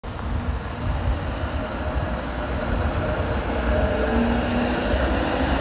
Soundscapes > Urban
A tram passing the recorder in a roundabout. The sound of the tram accelerating can be heard. Recorded on a Samsung Galaxy A54 5G. The recording was made during a windy and rainy afternoon in Tampere.